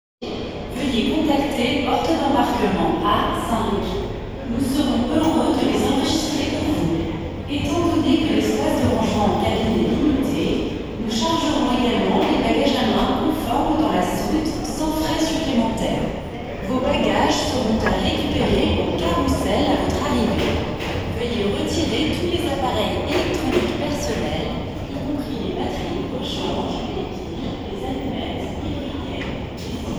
Soundscapes > Indoors
Recorded in Geneva Airport by phone, recording captures the atmosphere of interior soundscape and overhead announcements echo softly against the concourse.
ambient, terminal, sound, public, airport, travel, field, Geneva, indoors, background, announcements, space, noise, recording, airplane